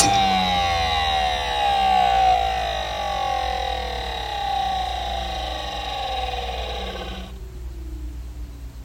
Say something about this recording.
Sound effects > Other mechanisms, engines, machines
Broken Hard Drive turning off
Hard Drive Abruptly Shutting Off